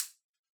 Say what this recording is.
Sound effects > Other mechanisms, engines, machines
Upside-down circuit breaker switch-000
When it's upside-down the switch reproduce a slightly different sound, a bit dryer and with a shorter release time (This one includes room). There are also samples in the pack that attend the antithesis. Please follow my socials, don't be rude..